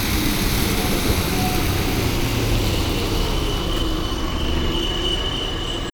Sound effects > Vehicles
A bus arriving in Tampere, Finland. Recorded with OnePlus Nord 4.